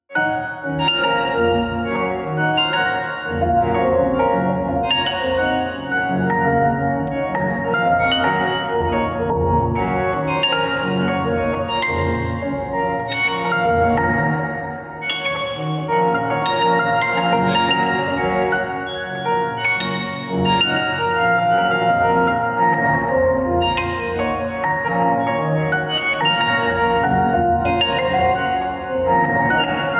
Music > Multiple instruments
Botanica-Granular Piano Ambient 3
Processed with Khs Convovler, Khs 3-band EQ, Khs Slice EQ, Khs Multipass, ZL EQ, Fruty Limiter.
Botanica, Cinematic, Effect, Epic, FX, Loop, Orchestral, Piano, Tension, Video